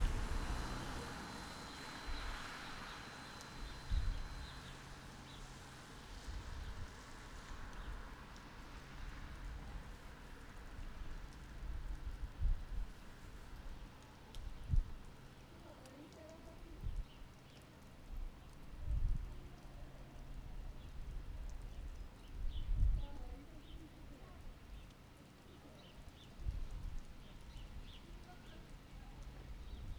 Soundscapes > Nature
Daytime ambient sounds with birds
bird, birds, nature, field-recording